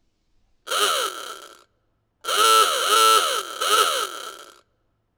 Sound effects > Vehicles
1930 Ford Model A horn, recorded on a Rode iXY at a vintage car display in New Zealand
antique, auto, horn, car, vintage